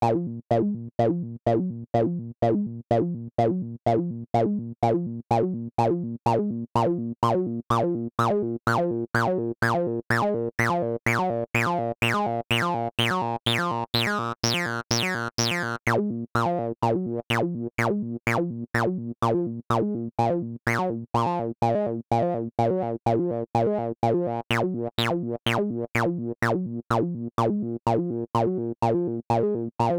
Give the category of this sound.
Music > Solo instrument